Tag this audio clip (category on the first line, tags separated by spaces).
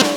Instrument samples > Percussion
corpsegrind trigger blastbeat drums strike-booster metal click goregrind snare dynamic attack trig rhythm beat mainsnare grind-metal